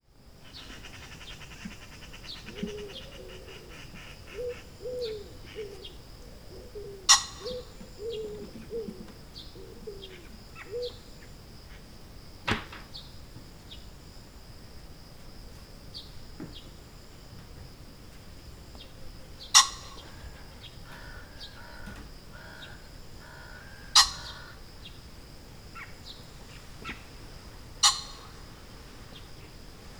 Soundscapes > Nature
burdocia dawn 2
Soundscape of dawn in the countryside. You can hear: turtledoves, pheasants, magpies, hooded crows, sparrows and several other unidentified birds. In the background, there is the chirping of crickets and the sound of cars in the distance or passing on a gravel road near the house. Noteworthy points #4:00 a pheasant flutters away, perhaps frightened by something #5:41 sparrow #9:00 magpie and its calls #19:24 green woodpecker Recording made with a Zoom H1n recorder with windscreen positioned on the windowsill of a first-floor window in a country house, pointing towards the lawn in front of the house. Approximate time: 2:30 a.m.
ambience, birds, dawn, field-recording, freesound20, italy, nature, outdoor, summer